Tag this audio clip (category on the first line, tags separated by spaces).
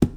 Sound effects > Objects / House appliances
garden water plastic tip container household knock shake pail bucket tool drop fill pour object liquid handle spill clang hollow carry lid slam scoop metal foley clatter kitchen debris cleaning